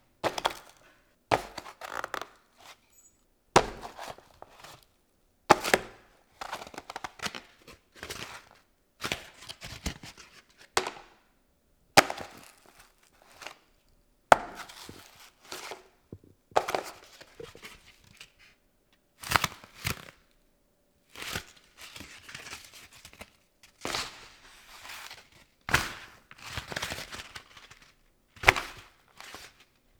Sound effects > Objects / House appliances
Various sounds made from cardboard.